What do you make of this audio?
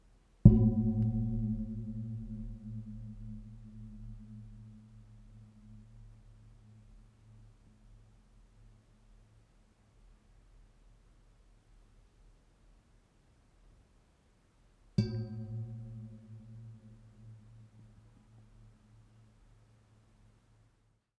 Sound effects > Human sounds and actions
Hollow metallic sound produced by striking a metal pipe.